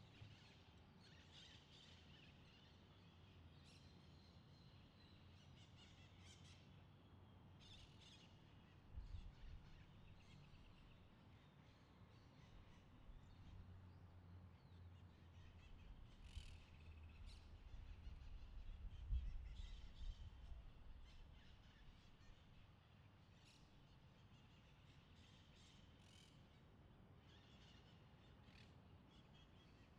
Soundscapes > Urban
Sitting at Las Heras Park - Morning (ST)
Recorded with Zoom H6studio XY built-in microphones.
Birds, Cars, Morning, Park, Sitting, Wind